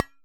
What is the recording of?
Sound effects > Objects / House appliances
Solid coffee thermos-005
percusive, recording